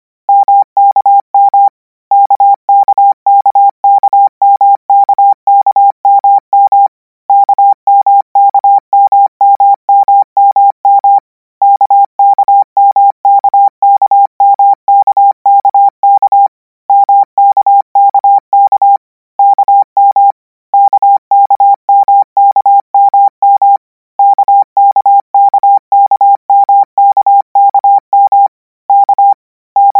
Sound effects > Electronic / Design
Koch 02 KM - 200 N 25WPM 800Hz 90%
Practice hear letter 'KM' use Koch method, 200 word random length, 25 word/minute, 800 Hz, 90% volume.
morse, radio, letters, codigo, code